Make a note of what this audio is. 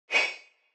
Sound effects > Other
Sword Swing 1
Sword swing effect created by combining a knife recording and a swing sound I designed with a synth.
ataque, attack, battle, blade, cut, espada, hit, knife, metal, sharp, slash, swing, sword, weapon, woosh